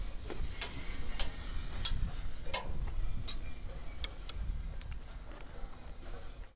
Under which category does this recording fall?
Sound effects > Objects / House appliances